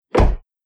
Sound effects > Vehicles
Car Door Close 1
A car door closing.